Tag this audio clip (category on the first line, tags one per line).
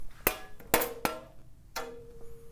Sound effects > Other mechanisms, engines, machines
foley
fx
handsaw
hit
household
metal
metallic
perc
percussion
plank
saw
sfx
shop
smack
tool
twang
twangy
vibe
vibration